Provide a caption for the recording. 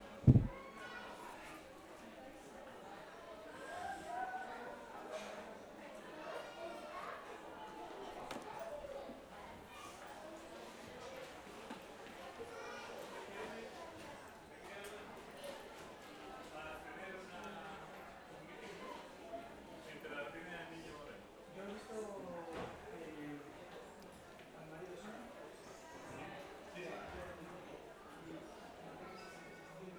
Soundscapes > Urban
Eindhoven public library, point 4 / / / 11:00 - 11:05 . ...Wednesday November 12 2025!! Recorded with my ZOOM H5 Frequent sounds: Sounds from the cafe bleeding through, conversations, giggles and chairs shuffling.
field, ambient, atmophere, recording